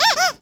Sound effects > Objects / House appliances
TOYMisc-Samsung Galaxy Smartphone, CU Single Squeak Nicholas Judy TDC
A single toy squeak. Recorded at Lowe's.